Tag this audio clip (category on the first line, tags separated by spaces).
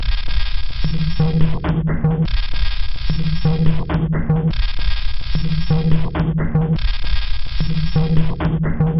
Instrument samples > Percussion
Ambient Dark Industrial Loop Packs Samples Soundtrack Underground Weird